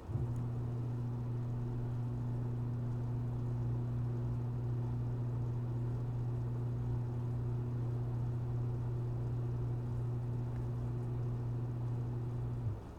Sound effects > Objects / House appliances
Garage door in distance at interior perspective.